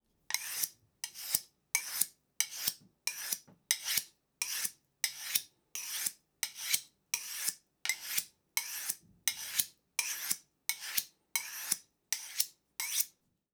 Sound effects > Objects / House appliances
Sharpening a knife with a musat. Recorded in a real kitchen on Tascam Portacapture X8. Please write in the comments where you plan to use this sound. I think this sample deserves five stars in the rating ;-)